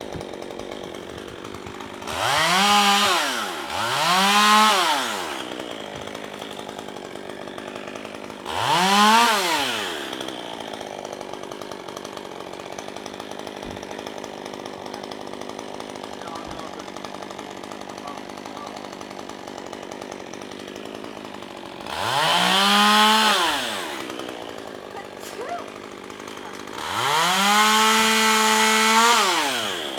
Soundscapes > Other
Stihl chainsaw with sneeze and planes
Crew from Malamute Tree Services uses a chainsaw to remove branches from a May tree at a residence in the Riverdale neighbourhood of Whitehorse, Yukon. Sounds include both idling and intermittent sawing, with some voices and a random sneeze in the mix as well. When the saw finally shuts off, a small plane and more distant jet can be heard flying overhead. More voices and car horn in background. Recorded on handheld Zoom H2n in stereo on August 26, 2025.
chainsaw, aircraft, yukon, idle, flyover, whitehorse, riverdale, noise, stihl, city, engine, field-recording, sneeze, tree-pruning